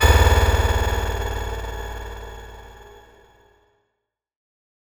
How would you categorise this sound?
Sound effects > Electronic / Design